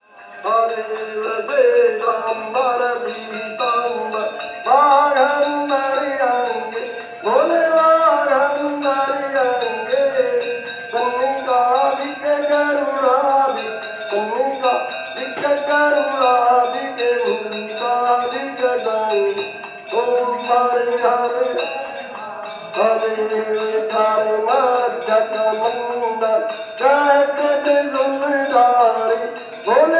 Soundscapes > Urban
Sound recorded in India where I explores the loudness produced by human activity, machines and environments in relation with society, religion and traditional culture.